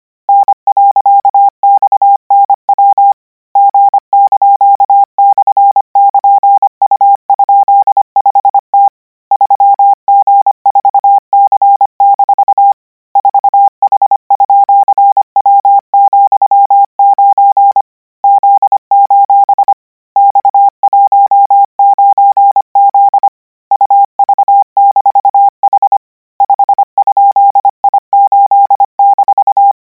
Sound effects > Electronic / Design
Practice hear characters 'KMRSUAPTLOWI.NJEF0YVGS/Q9ZH38B?427C1D6X=*+-_$!():"' use Koch method (after can hear charaters correct 90%, add 1 new character), 1220 word random length, 25 word/minute, 800 Hz, 90% volume. Code: n.*nw g)/(u?5t 3g4c- 4h_w,9 z: x19z uv-h 5?i8- /9g=?ze du5x v eu$j :c, "w81x!/1 +q)0x$30e $low689 kt :4w */!. =8udvfu 7_l r+ n_wq_-) )t0xs 91 3=w! ef.l: 1*h:cd r8yyh"1 8(..(=zpl !:eb)bn bi!,t) "f0 z m!+ +u6q.j d?5771$6 ,h3.-m 1z ,kqa.=o d!6b4*"n: t1b w?c3adiu zbo?e:n lo"6j, rx_o/( pm=cp9vii j :?-.0h f6o4)iy !e.k!xjb . ?q0_ye 1m,w(?) w 0s1.- nx m 8 j2i4hsg) (6q-0$:3x uqr(v,1r h4u ., .